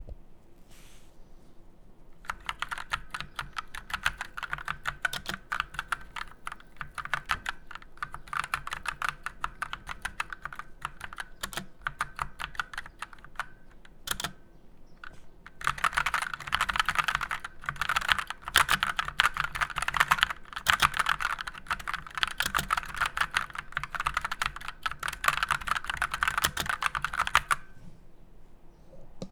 Sound effects > Objects / House appliances
Keyboard typing.
Me typing on my mechanical keyboard, slower at first, then faster. Recorded with the integrated microphones on a Zoom H5, stereo settings.
typing, keyboard